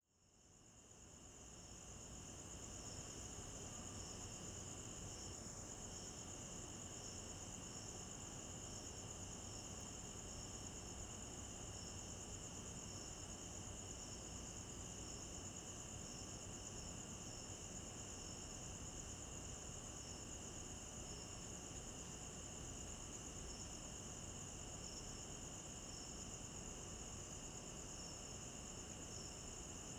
Soundscapes > Urban
250827 2938 PH Quiet night in a Filipino suburb
Quiet night in a Filipino suburb. I made this recording at about 2:00AM, from the terrace of a house located at Santa Monica Heights, which is a costal residential area near Calapan city (oriental Mindoro, Philippines). One can hear insects (mostly crickets as well as a slight hum, probably from the neighbours’ air conditioner. In the distance, dogs barking, geckos from time to time, some vehicles passing by, and in the second part of the file, some roosters slowly waking up. At #11:48, probably a bat flying over ? (please confirm if you know !) Recorded in August 2025 with an Olympus LS-P4 and a Rode Stereo videomic X (SVMX). Fade in/out applied in Audacity.
ambience, atmosphere, barking, bat, Calapan-city, calm, crickets, distant, dog, dogs, field-recording, gecko, general-noise, insects, night, Philippines, quiet, rooster, roosters, soundscape, suburb, suburban, vehicles